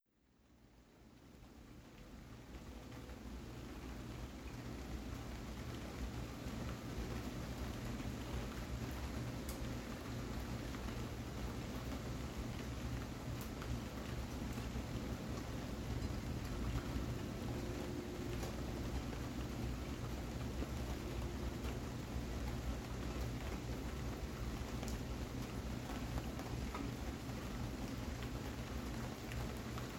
Soundscapes > Nature
Heavy rain and rumbling thunder.